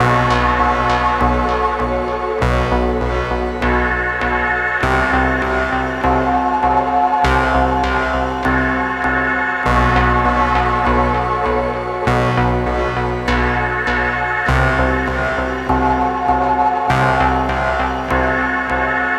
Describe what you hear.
Music > Solo instrument
100 C Polivoks Brute 02
Melodic loops made with Polivoks and Casio SK1 analogue synths
80s, Analog, Analogue, Brute, Casio, Electronic, Loop, Melody, Polivoks, Soviet, Synth, Texture, Vintage